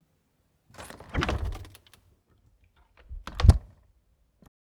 Sound effects > Objects / House appliances
Fridge open/close
Stereo recording of fridge opened and closed